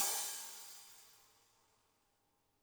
Music > Solo percussion
Recording ook a crash cymbal with all variations